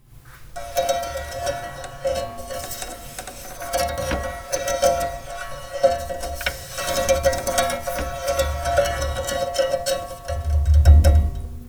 Sound effects > Other mechanisms, engines, machines
Dewalt 12 inch Chop Saw foley-046
Blade, Chopsaw, Circularsaw, Foley, FX, Metal, Metallic, Perc, Percussion, Saw, Scrape, SFX, Shop, Teeth, Tool, Tools, Tooth, Woodshop, Workshop